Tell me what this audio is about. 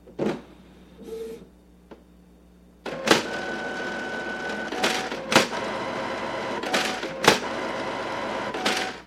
Sound effects > Objects / House appliances
Recording of a Canon Pixma TS3720 all-in-one printer. To get this recording, the microphones were placed inside the edge of the printer. Then we set the printer off. Do not attempt this yourself without an expert assisting you, as I had a printers expert with me when setting this up.